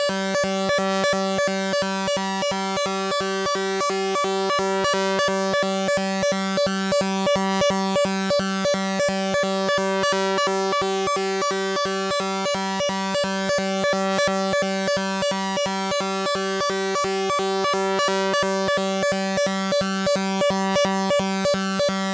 Sound effects > Electronic / Design
Clip sound loops 10
8-bit, clip, fx, game